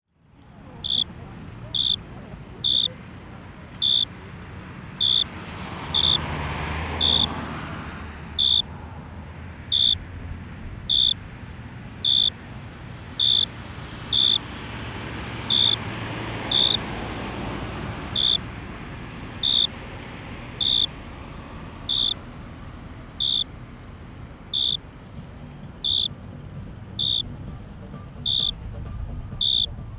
Soundscapes > Urban
Grillo y candombe de fondo
Cars passing by near the park, with the cricket in the foreground. A car passes by with electronic music playing and candombe on the background.
AMBIENTAL, cricket, field-recording, montevideo, park, uruguay